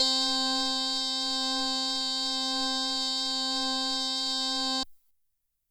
Instrument samples > Synths / Electronic
Synth organ patch created on a Kawai GMega synthesizer. C6 (MIDI 84)